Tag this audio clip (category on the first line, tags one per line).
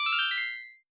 Sound effects > Electronic / Design
coin
designed
game-audio
high-pitched
pick-up
tonal